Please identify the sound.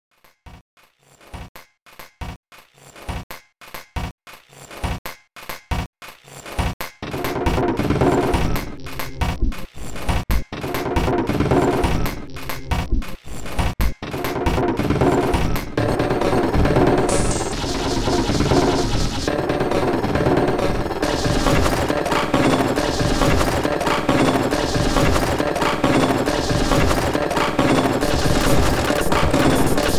Music > Multiple instruments
Demo Track #2931 (Industraumatic)
Soundtrack, Horror